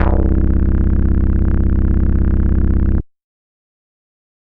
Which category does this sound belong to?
Instrument samples > Synths / Electronic